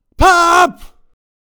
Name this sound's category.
Speech > Solo speech